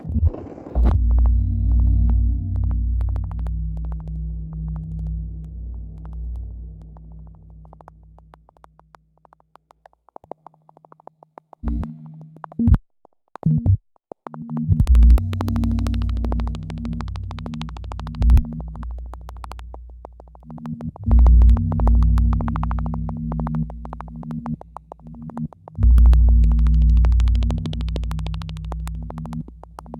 Soundscapes > Synthetic / Artificial
Atonal texture full of glitches and grains AI generated Software: Suno Prompt: Granular atonal ambient texture full of glitches